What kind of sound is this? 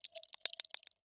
Sound effects > Objects / House appliances
Jewellerybox Shake 12 Texture
Shaking a ceramic jewellery container, recorded with an AKG C414 XLII microphone.
jewellery-box, trinket-box, jewellery